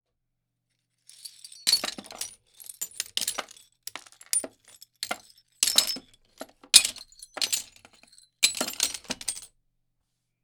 Sound effects > Objects / House appliances
Clatter of glass falling onto glass from a short distance, no shatter
The sound of glass falling on glass. Could be used for a breaking a window, or in my case I used it for a monster crawling through a broken window. Made in studio, no background noise.
breaking, clatter, falling